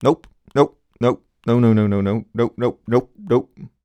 Speech > Solo speech
Fear - Nope no nope no nonono nope
dialogue, fear, FR-AV2, Human, Male, Man, Mid-20s, Neumann, no, nope, NPC, oneshot, singletake, Single-take, talk, Tascam, U67, Video-game, Vocal, voice, Voice-acting, worried